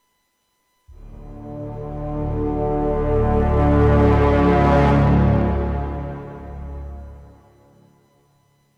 Sound effects > Electronic / Design
Synth horror drop
creepy, freaky, horror